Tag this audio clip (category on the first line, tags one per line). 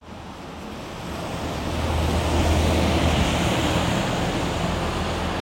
Soundscapes > Urban
transportation
bus
vehicle